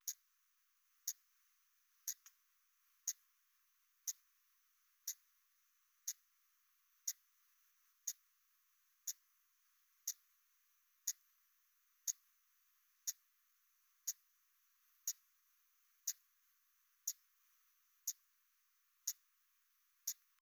Sound effects > Other mechanisms, engines, machines
the sound of a timex weekender watch ticking in a regular pattern that can be used as a loop or pitch corrected to fit a bigger or slower clock. I applied some processing by using the noise reducer on adobe audition to make the sound as quiet as possible, due to how quiet the ticking is there was a lot of noise due to having the gain so high